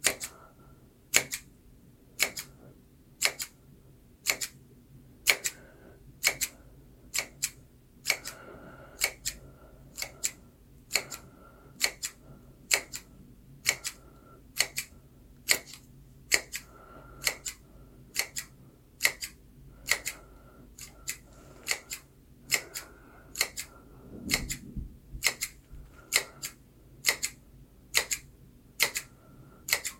Sound effects > Objects / House appliances
TOOLHand-Samsung Galaxy Smartphone, CU Wire Cutter, Cutting Nicholas Judy TDC
A wire cutter cutting.
cut, foley, Phone-recording, snip, wire-cutter